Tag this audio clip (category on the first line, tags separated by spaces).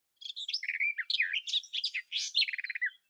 Sound effects > Animals
Bird birdsong field Garden recording UK warbler